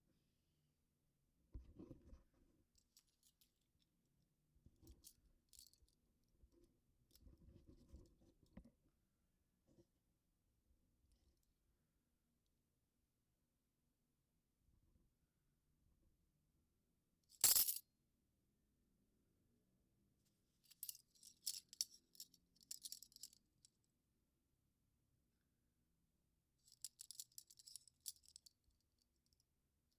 Sound effects > Other
Drop handcuffs in a carpet and manipulation with variations. Comica VM30 microphone and Zoom H6 Essential recorder.